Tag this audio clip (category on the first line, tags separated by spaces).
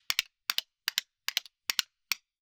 Instrument samples > Percussion
Gallop Hit Horse Minimal Musical Percussion Slap Spoon Strike Wood